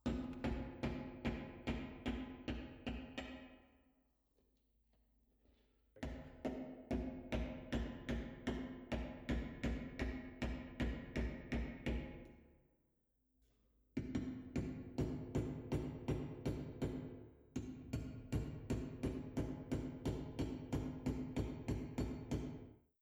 Sound effects > Objects / House appliances
A hammer hammering a nail in distance.